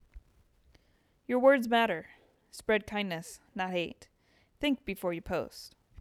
Speech > Solo speech
PSA – Be Kind Online
Encourages responsible and kind behavior in digital spaces. Script: "Your words matter. Spread kindness, not hate. Think before you post."
PositiveVibes, OnlineKindness, PSA, ThinkBeforeYouPost